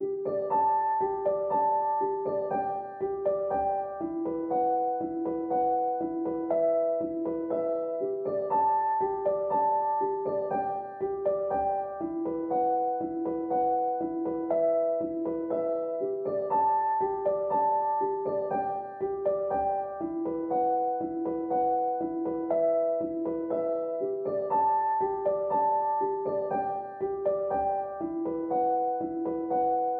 Music > Solo instrument
Piano loops 197 octave up short loop 120 bpm
music, 120, samples, simple, 120bpm, reverb, pianomusic, piano, free, simplesamples, loop